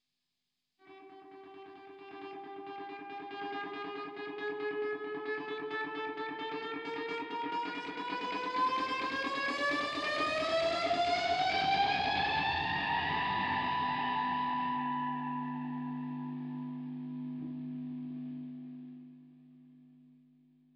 Music > Other
guitar riser 2
techno, guitar